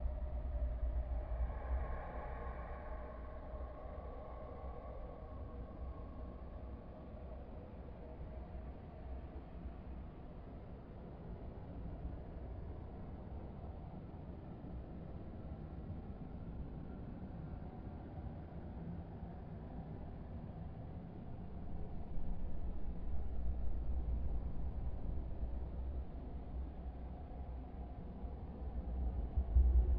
Soundscapes > Synthetic / Artificial
NEW SOUND! I created the atmosphere. I used my microphone and then edited it using Audacity.